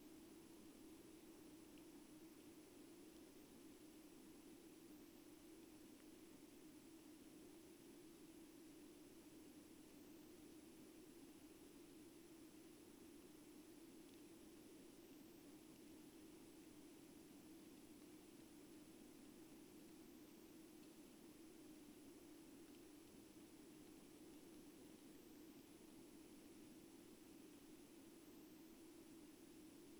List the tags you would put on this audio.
Soundscapes > Nature
phenological-recording
field-recording
artistic-intervention
sound-installation
modified-soundscape
nature
Dendrophone
raspberry-pi
weather-data
natural-soundscape
data-to-sound
alice-holt-forest
soundscape

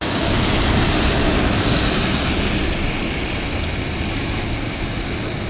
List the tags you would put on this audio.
Sound effects > Vehicles
vehicle
transportation
bus

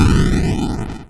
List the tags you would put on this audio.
Sound effects > Electronic / Design
sfx
soundeffect
fire
sound-design
abstract

